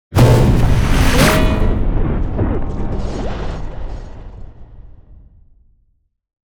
Sound effects > Other
Sound Design Elements Impact SFX PS 099
A powerful and cinematic sound design impact, perfect for trailers, transitions, and dramatic moments. Effects recorded from the field. Recording gear-Tascam Portacapture x8 and Microphone - RØDE NTG5 Native Instruments Kontakt 8 REAPER DAW - audio processing
cinematic, hit, power, rumble, shockwave, transient, heavy, effects, explosion, crash, sfx, blunt, audio, strike, collision, smash, design, sound, impact, thud, percussive, hard, force, bang, sharp, game